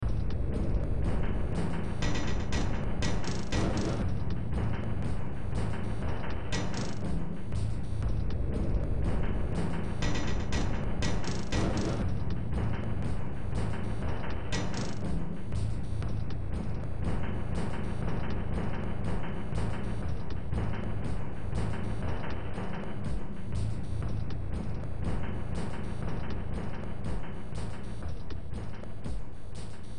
Music > Multiple instruments
Demo Track #2955 (Industraumatic)
Underground, Games, Soundtrack, Industrial, Cyberpunk, Noise, Sci-fi, Horror, Ambient